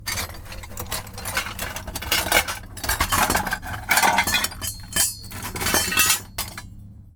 Sound effects > Objects / House appliances
Junkyard Foley and FX Percs (Metal, Clanks, Scrapes, Bangs, Scrap, and Machines) 140
Bash, Perc, Bang, Atmosphere, Smash, trash, waste, Metallic, dumpster, Metal, Machine, FX, Environment, Clank, rubbish, garbage, Clang, tube, Ambience, Dump, rattle, Percussion, dumping, Robotic, SFX, Robot, Foley, Junk, scrape, Junkyard